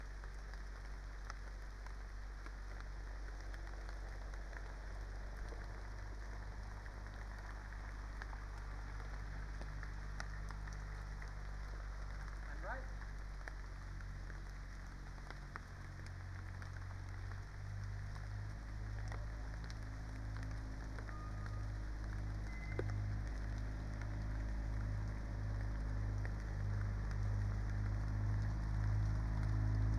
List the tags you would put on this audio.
Soundscapes > Nature
alice-holt-forest
data-to-sound
Dendrophone
field-recording
modified-soundscape
phenological-recording
raspberry-pi
sound-installation
weather-data

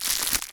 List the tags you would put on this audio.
Sound effects > Animals
spider
bite
insect
eating

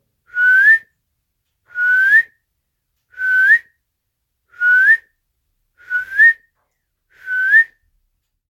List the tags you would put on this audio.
Sound effects > Human sounds and actions
human
mouth

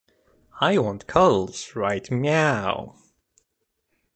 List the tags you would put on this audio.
Speech > Solo speech
cuddles
male
phrase
voice
words

